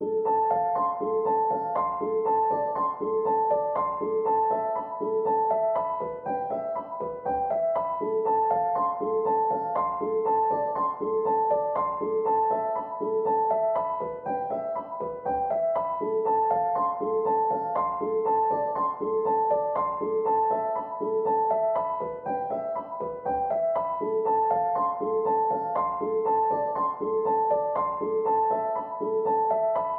Music > Solo instrument
Piano loops 194 octave up long loop 120 bpm
120, 120bpm, free, loop, music, piano, pianomusic, reverb, samples, simple, simplesamples